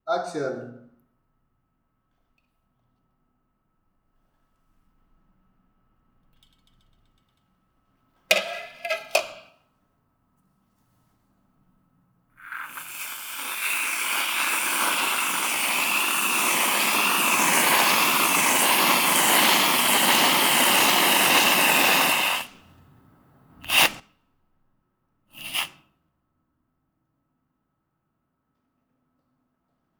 Sound effects > Objects / House appliances
Serving Strawberries and Whipped Cream and Eating from the Cup 1
Someone fills a dessert cup with strawberries, then tops it off with whipped cream from a can and then proceeds to eat straight from the cup, making animalistic noises.
Cream, Eating, Gastronomy, Whipped